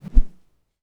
Sound effects > Natural elements and explosions
Stick - Whoosh 15 (double whoosh)
Swing, SFX, Transition, tascam, FR-AV2, oneshot, NT5, fast, stick, swinging, whoosh, Rode, Woosh, one-shot, whosh